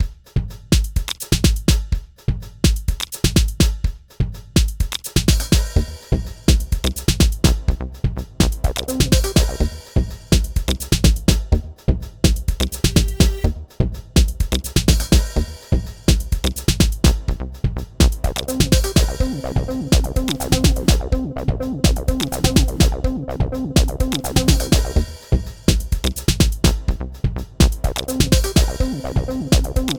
Music > Multiple instruments
A bit longer rhythmic music theme with loops and risers